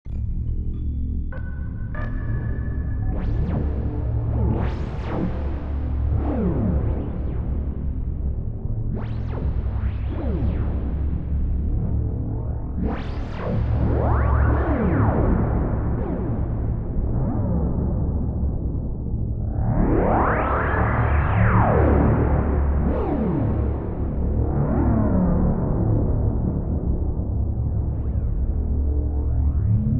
Soundscapes > Synthetic / Artificial
Nebulous Technozoid
Proving its versatility, Beast Mode is not just for heavy bass sounds. It can also produce these futuristic, technotic soundscapes. Great for gaming and films.